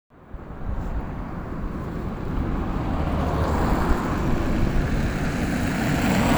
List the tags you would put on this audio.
Sound effects > Vehicles
car
traffic
vehicle

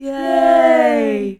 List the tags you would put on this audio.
Speech > Other
yay
celebration

